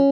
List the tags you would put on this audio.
Instrument samples > String
arpeggio
design
guitar
sound
stratocaster
tone

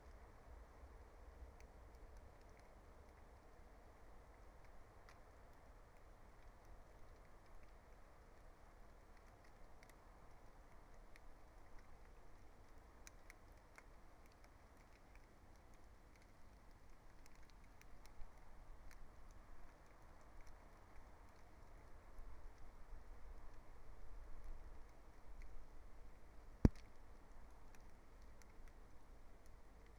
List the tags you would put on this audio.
Soundscapes > Nature
soundscape
phenological-recording
alice-holt-forest
meadow
nature
natural-soundscape
field-recording
raspberry-pi

